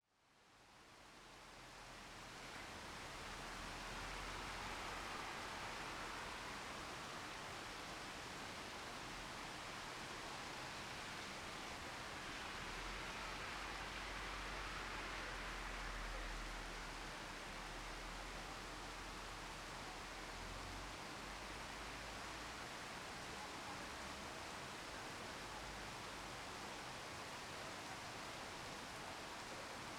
Soundscapes > Urban
Rainstorm and loud thunderclap on a French suburb. This recording has been made from the balcony of my flat, located in Nanterre (western suburbs of Paris, France). As it was the end of summer, a strong rainstorm came suddenly. I just had the time to set the recorder on the balcony : First, one can hear heavy rain with some cars passing by in the wet street, and at #00:57, a very loud thunderclap strikes on the left. Then, the storm continues, with more distant thunderclaps and rain becoming gradually lighter, while some vehicles and some passers by’s voices can be heard. Recorded in August 2025 with a Zoom H5studio (built-in XY microphones). Fade in/out applied in Audacity.